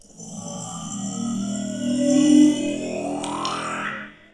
Sound effects > Experimental
Creature Monster Alien Vocal FX-31
Alien, Animal, boss, Creature, Deep, demon, devil, Echo, evil, Fantasy, Frightening, fx, gamedesign, Groan, Growl, gutteral, Monster, Monstrous, Ominous, Otherworldly, Reverberating, scary, sfx, Snarl, Snarling, Sound, Sounddesign, visceral, Vocal, Vox